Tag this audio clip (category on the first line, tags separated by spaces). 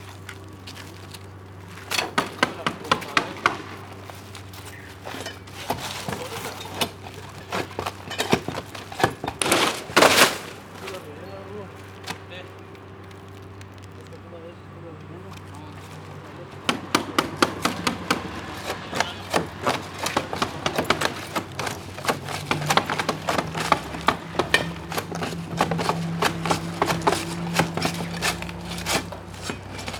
Soundscapes > Urban
bang construction field-recording hammer loud noisy roofing urban